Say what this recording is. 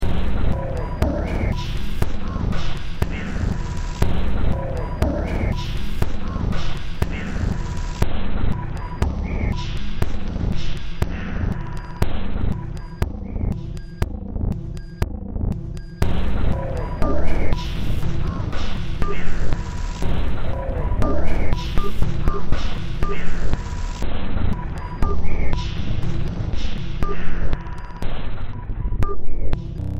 Music > Multiple instruments
Demo Track #3151 (Industraumatic)
Soundtrack, Games, Sci-fi, Horror, Ambient, Cyberpunk, Noise, Industrial, Underground